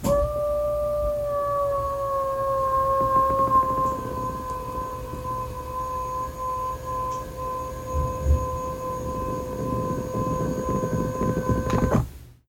Sound effects > Objects / House appliances
MOTRSrvo-Samsung Galaxy Smartphone, CU Servo Motor, Bed, Raise, Creaks Nicholas Judy TDC
A bed servo motor raising with creaks.
bed, creak, motor, Phone-recording, raise, servo